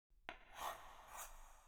Sound effects > Other
slide stone long 2
stones, slide, rock, stone